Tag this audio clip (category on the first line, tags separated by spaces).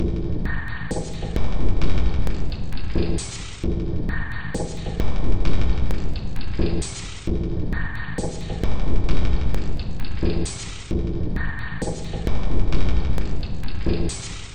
Instrument samples > Percussion
Alien Industrial Underground Loopable Loop Drum Ambient Soundtrack Weird Dark Packs Samples